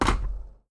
Sound effects > Objects / House appliances
A telephone receiver being hung up.